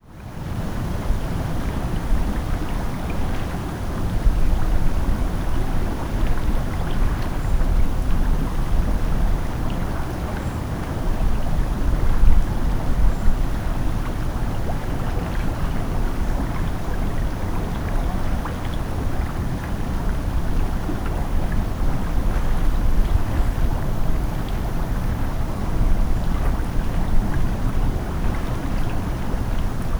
Soundscapes > Nature
A small stream flows through Tenorio National Park in Costa Rica. The water bubbles pleasantly, and the wind blows through the trees. Recorded with an Olympus LS-14.